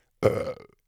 Sound effects > Human sounds and actions
Belch and exhale recorded on RE-20 in treated room. Thank you for using my sound for your project.
food, crowd-noise, human, restaurant, beer, eating, man, alcohol, pub, rude, public-chatter, people, drunk, male, burp, gross, bar